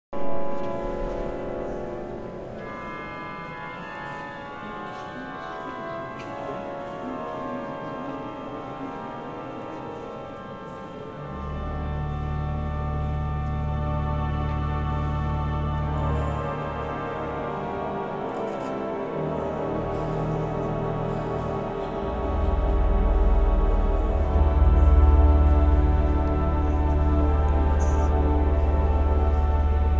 Music > Solo instrument
organo Friburgo (friburg church organ)
cellphone recording of organ tuning tests in Friburg church
music, organ